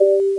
Sound effects > Electronic / Design
note G blip electro
from a scale of notes created on labchirp for a simon-type game of chasing sounds and flashes.
blip, electro, scales, single-note, tonal